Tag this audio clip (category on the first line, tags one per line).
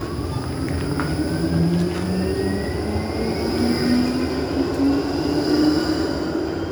Sound effects > Vehicles
tram
transportation
vehicle